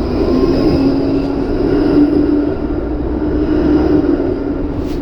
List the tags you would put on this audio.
Sound effects > Vehicles
tramway
transportation
vehicle

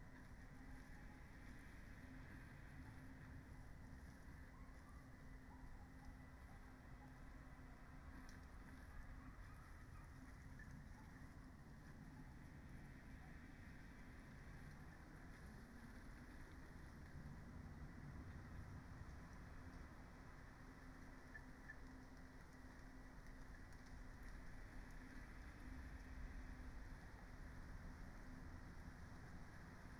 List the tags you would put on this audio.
Soundscapes > Nature
raspberry-pi
natural-soundscape
soundscape
artistic-intervention
sound-installation
alice-holt-forest
phenological-recording
Dendrophone
field-recording
nature
modified-soundscape
weather-data
data-to-sound

